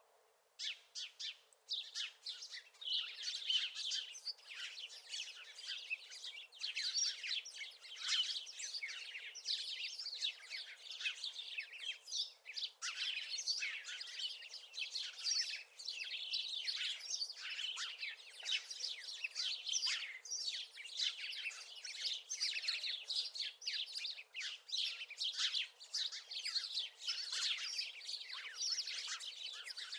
Sound effects > Animals
Flock of sparrows chirping. Location: Poland Time: November 2025 Recorder: Zoom H6 - SGH-6 Shotgun Mic Capsule